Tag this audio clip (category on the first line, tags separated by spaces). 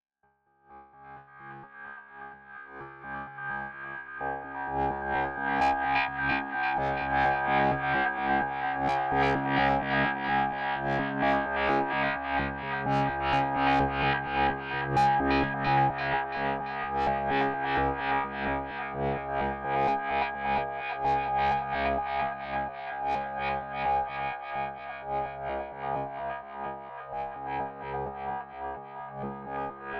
Soundscapes > Synthetic / Artificial
low wind texture ambience long shifting slow sfx roar experimental bassy rumble dark shimmering glitchy